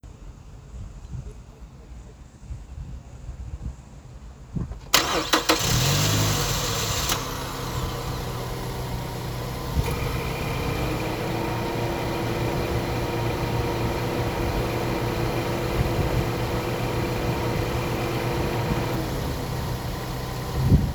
Sound effects > Vehicles
Engine Ignition Outside
Car ignition during a windy day; Knocking and whines from the starter motor can be heard, along with initial engine revving. Recorded on the Samsung Galaxy Z Flip 3. Minor noise reduction has been applied in Audacity. The car used is a 2006 Mazda 6A.
automobile, car, car-exterior, engine, ignition, start